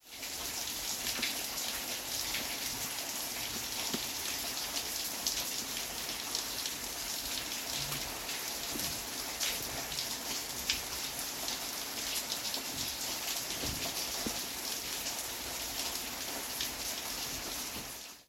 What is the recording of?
Soundscapes > Nature
RAIN Freezing, Cold Winter Night Nicholas Judy TDC
Freezing rain in a cold winter night.
cold, freezing-rain, nature, night, Phone-recording, winter